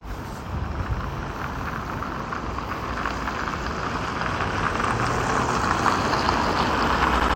Sound effects > Vehicles
Car driving by. Recorded with a phone on a cold, cloudy day.
car, tire, road